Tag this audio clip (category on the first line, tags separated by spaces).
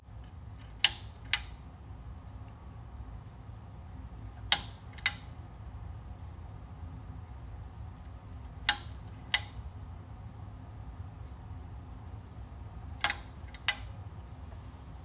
Sound effects > Objects / House appliances
home household lamp switch